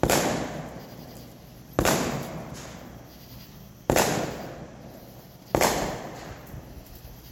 Sound effects > Natural elements and explosions
FRWKComr-Samsung Galaxy Smartphone, CU Firework, Explosions, X4 Nicholas Judy TDC
Four large firework explosions.
explosion, four, fireworks, Phone-recording, large